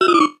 Sound effects > Electronic / Design
Synthy Drops
A delightful lil chime/ringtone, made on a Korg Microkorg S, edited and processed in Pro Tools.
blip, microkorg, ringtone, chirp, electronic, gui